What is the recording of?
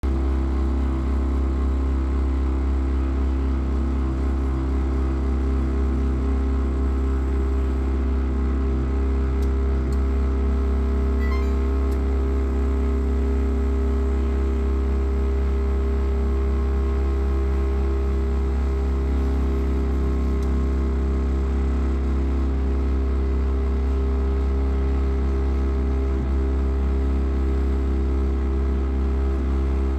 Sound effects > Other mechanisms, engines, machines
The sound at #0:11 is just the thing plinging to say its all warmed up. Yes we got a new one